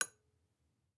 Sound effects > Other mechanisms, engines, machines
High Tap 02
noise, sample, tap